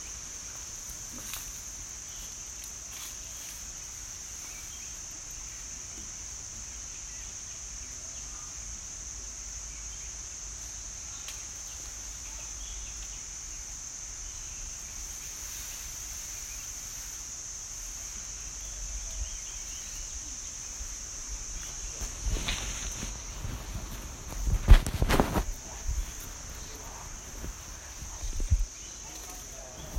Soundscapes > Nature
Forest Ambience, Chiang Mai, Thailand (March 7, 2019)
This is a field recording of a forest in Chiang Mai, Thailand, captured on March 7, 2019. You can hear the natural soundscape, including birds, insects, and distant rustling leaves.
ambience, birds, Chiang, field, forest, insects, jungle, Mai, nature, recording, Thailand, tropical